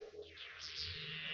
Soundscapes > Synthetic / Artificial
LFO Birdsong 17
birds, Lfo, massive